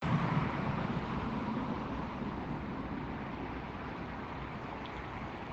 Soundscapes > Urban
Distant sounds of cars on a highway and a semi-distant decelerating tram. Recorded with the default device microphone of a Samsung Galaxy S20+